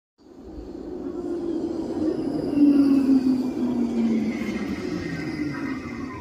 Soundscapes > Urban
final tram 28
finland, hervanta, tram